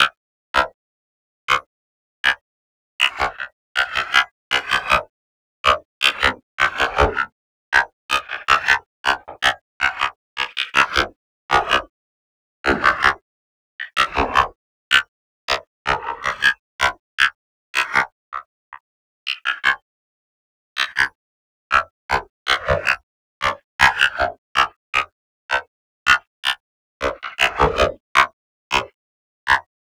Sound effects > Electronic / Design
RGS-Random Glitch Sound 3
A random glitch sound with phaseplant granular. Sample used from Bandlab. Processed with ZL EQ, Waveshaper, Flstudio original Sampler, Vocodex.
Glitch, Robot, Texture